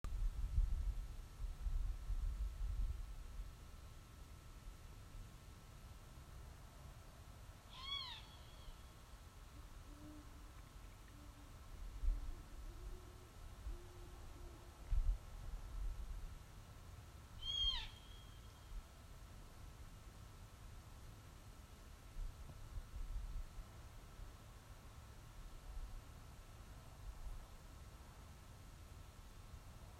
Soundscapes > Nature
Great horn owl, barn owl and hot tub 09/22/2021
Great horn owl, barn owl and hot tub sound
hot-tub-hum, barn-owl, great-horn-own